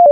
Sound effects > Electronic / Design
UV-5RM "exiting something" sfx
created in audacity with sine wave generator, listened to frequency using android app spectroid the radio usually makes this sound when exiting NOAA or FM
sine-wave, radio, electronic